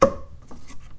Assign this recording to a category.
Sound effects > Other mechanisms, engines, machines